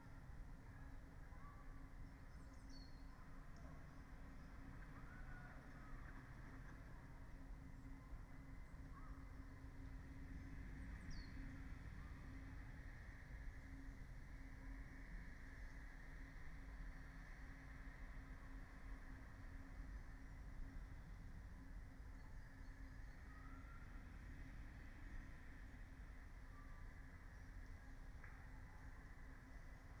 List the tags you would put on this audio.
Soundscapes > Nature
sound-installation
artistic-intervention
weather-data
Dendrophone
data-to-sound
raspberry-pi
soundscape
modified-soundscape
field-recording
phenological-recording
natural-soundscape
alice-holt-forest
nature

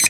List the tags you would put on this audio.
Sound effects > Electronic / Design
beep
electronic
Phone-recording
set
single
timer

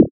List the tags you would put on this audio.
Instrument samples > Synths / Electronic
bass
fm-synthesis

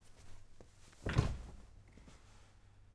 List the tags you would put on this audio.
Sound effects > Objects / House appliances
furniture
home
human
interior
sofa

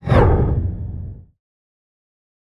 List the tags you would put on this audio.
Sound effects > Other
dynamic
ambient
whoosh
trailer
sweeping
design
audio
effect
fast
swoosh
cinematic
elements
effects
element
sound
production
fx
movement
film
motion
transition

